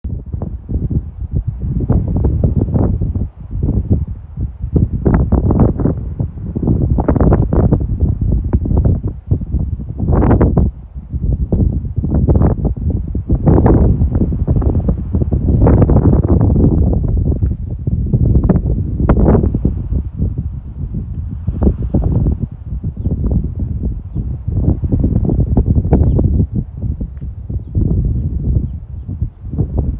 Sound effects > Natural elements and explosions
Rapid Wind at Sedona Vortex Airport
Rapid wind sound, recorded at the area known as the Vortex via Airport road in Sedona, Arizona. The area is known as a natural geomagnetic energy site.
energy, breeze, gust, air